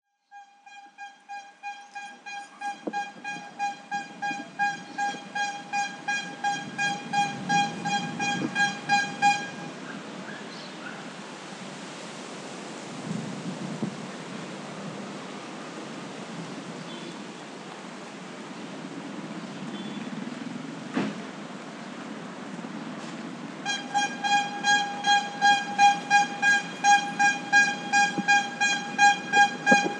Sound effects > Human sounds and actions
Heladero Santa Cruz Bolivia
Sounds of an ice cream street vendor with his horn. Traffic sounds on the back.